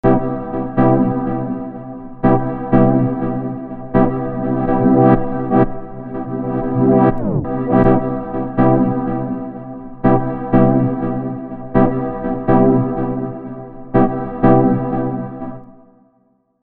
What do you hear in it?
Music > Solo instrument
Ableton Live.Simple VST....Sylenth....Synth 123 bpm Free Music Slap House Dance EDM Loop Electro Clap Drums Kick Drum Snare Bass Dance Club Psytrance Drumroll Trance Sample .